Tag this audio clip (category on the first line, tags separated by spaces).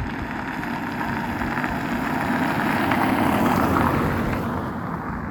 Sound effects > Vehicles
car studded-tires moderate-speed passing-by wet-road asphalt-road